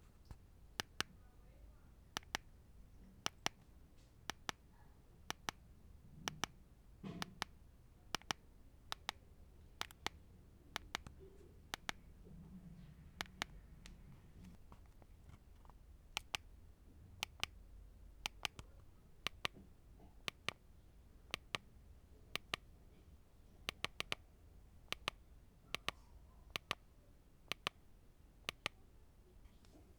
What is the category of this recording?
Sound effects > Objects / House appliances